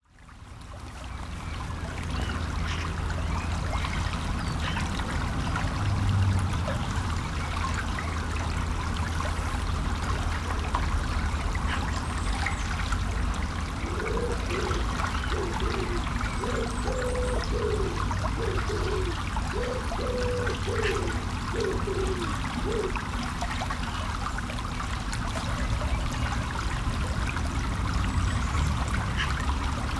Soundscapes > Nature
A recording of water flowing through a small stream in a residential area.